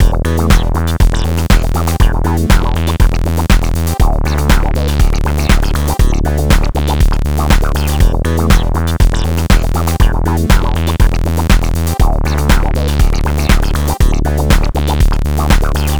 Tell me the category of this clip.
Music > Multiple instruments